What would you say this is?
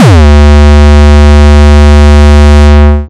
Instrument samples > Percussion
Garbber Kick3 D
Synthed with 3xOsc olny. Processed with Camel crusher, Fruity fast dist, Waveshaper.
Garbber, Hardstyle, Kick, Oldschool